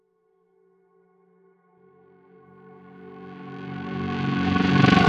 Music > Multiple instruments
Unsettling Crescendo 2
WARNING: These are loud and piercing at the end!